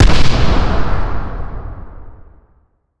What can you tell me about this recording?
Sound effects > Electronic / Design
Explosion Synth
An explosion effect created with a Synth and layered with itself.
boom, effect, explosion, game, movie, sfx, sound-design, sounddesign, soundeffect, synth